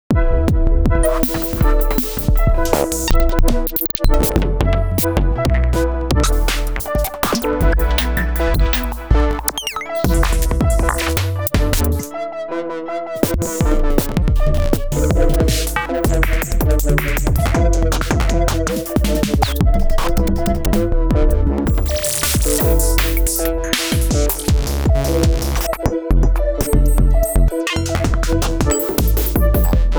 Music > Multiple instruments
IDM Glixxtch Tracker jungle gabber Break 160bpm
a heavily side-chained glitched out tracker style break beat sifting through a myriad of sounds and effects, VSTs used include Phase Plant, Microtonic, Shaperbox, Autoformer, FabFilter, FL Studio, and processed in Reaper. Thousands of individual drum synth samples in just over a minute. Enjoy~
160bpm, abstract, bass, beat, break, break-beat, breakbeat, dance, dnb, drum, drumbeat, drums, edm, electronic, fast, gabber, glitch, glitchy, hard, idm, jungle, loop, loopable, melody, perc, percussion, techno, tracker, weird, wtf